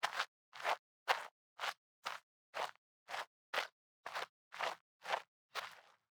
Sound effects > Human sounds and actions
10 variations of subtle footsteps on gravel recorded outdoors (no room or reverb at all) by students of the sound design workshop. GEAR: Zoom H4n Sennheiser MKH 50 P48